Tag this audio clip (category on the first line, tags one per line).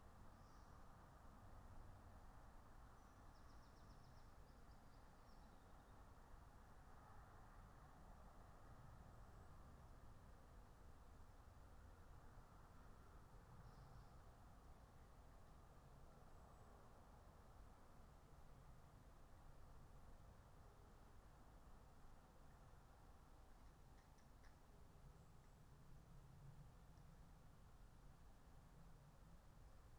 Soundscapes > Nature
data-to-sound
raspberry-pi
phenological-recording
natural-soundscape
Dendrophone
sound-installation
soundscape
modified-soundscape
nature
field-recording
weather-data
artistic-intervention
alice-holt-forest